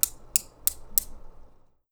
Sound effects > Objects / House appliances
FOLYProp-Blue Snowball Microphone, CU Drumstick, Hit Another Drumstick, For Drums To Start Playing Nicholas Judy TDC
A drumstick hitting another drumstick for the drums to start playing.
drummer, foley, hit, Blue-Snowball, drumstick, Blue-brand